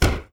Sound effects > Natural elements and explosions
Explosion 5 (Burning Car rec by Ñado)
Explosion from a burning car.